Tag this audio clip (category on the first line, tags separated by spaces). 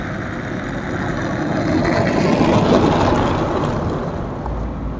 Sound effects > Vehicles
automobile car vehicle